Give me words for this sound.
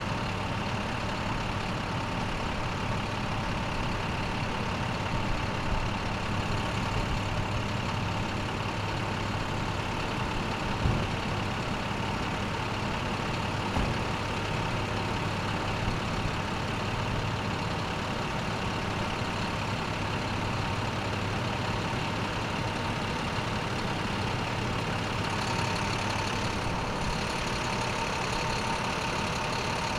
Sound effects > Other mechanisms, engines, machines
Medium duty idle and drive away 9.3
Medium duty medic truck idling then driving away in ambulance bay at OSU University Hospital, Ohio. Also heard in recording is truck doors closing. Recorded by me on zoom h1essential September, 2025